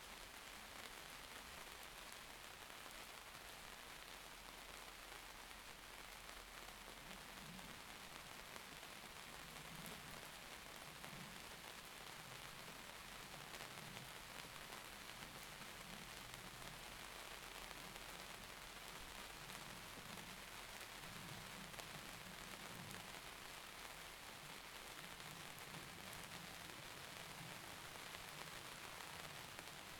Soundscapes > Nature
24h ambiance pt-01 - 2025 04 15 20H20 - 21h00 Gergueil Greenhouse
field-recording, H2N, MS, Rural